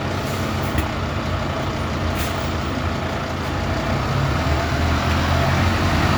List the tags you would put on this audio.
Sound effects > Vehicles
bus
transportation
vehicle

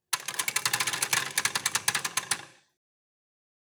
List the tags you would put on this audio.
Sound effects > Other mechanisms, engines, machines
machinery
chain
gears
loadingdoor
mechanical

